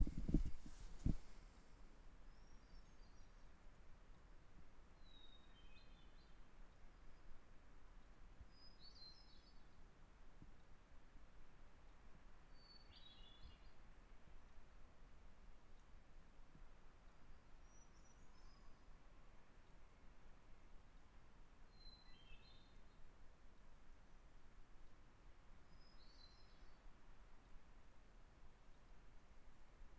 Soundscapes > Nature
Hermit Thrush as heard from inside tent at Silver Salmon Creek, Lake Clark National Park, Alaska
Bird, Alaska, Thrush